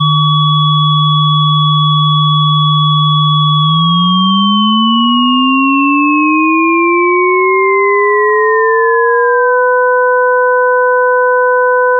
Sound effects > Other
A sound made by individual sinusopidal partials that begin with an inharmonic relation and progressively go to a harmonic relation. When this happens, the partials fusion in a single sound made up by the sum of the the partials, now harmonics.